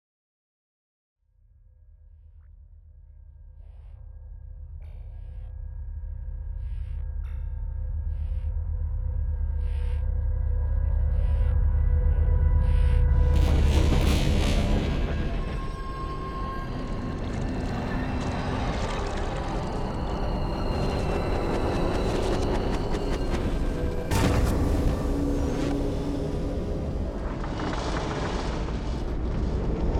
Soundscapes > Other
Epic Dark Trailer Soundscape 001
An epic dark soundscape with cinematic atmosphere. Perfect for trailers, teasers, short films, and multimedia projects. Featuring deep bass, evolving textures, and a suspenseful build-up that creates tension and mystery.
intense, cinematic, ambient, trailer, soundscape, soundtrack, movie, suspense, dark, epic, atmosphere, background, dramatic, mystery, tension, score